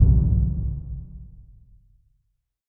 Sound effects > Electronic / Design
OBSCURE RATTLING BURIED EXPLOSION
BOOM, BASSY, EXPLOSION, RATTLING, IMPACT, EXPERIMENTAL, TRAP, DEEP, INNOVATIVE, UNIQUE, RAP, HIPHOP, LOW, DIFFERENT, RUMBLING, HIT